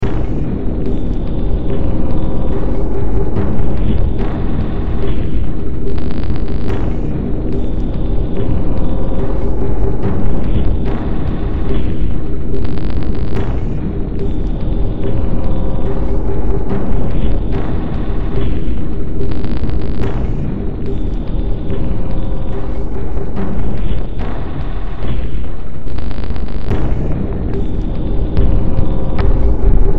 Music > Multiple instruments
Demo Track #2983 (Industraumatic)
Horror, Games, Industrial, Underground, Cyberpunk, Ambient, Soundtrack, Noise, Sci-fi